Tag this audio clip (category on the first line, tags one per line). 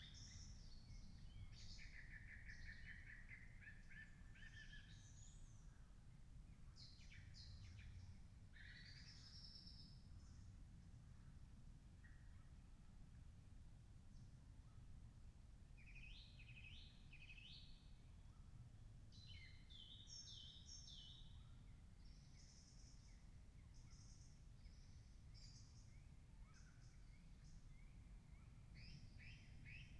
Soundscapes > Nature
artistic-intervention
nature
phenological-recording
sound-installation
data-to-sound
Dendrophone
modified-soundscape
weather-data
soundscape
natural-soundscape
alice-holt-forest
field-recording
raspberry-pi